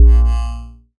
Instrument samples > Synths / Electronic
BUZZBASS 1 Bb
additive-synthesis, bass, fm-synthesis